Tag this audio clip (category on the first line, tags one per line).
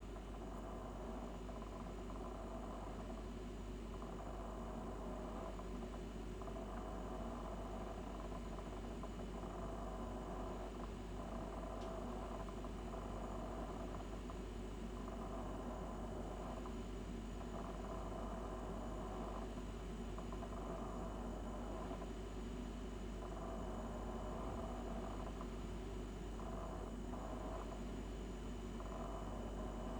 Sound effects > Other mechanisms, engines, machines
Machine
low-frequency
Working
refrigerator